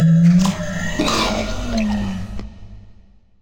Sound effects > Experimental
Creature Monster Alien Vocal FX (part 2)-062
weird, dripping, snarl, Monster, demon, growl, bite, devil, zombie, gross, Alien, otherworldly, grotesque, Sfx, fx, howl, mouth, Creature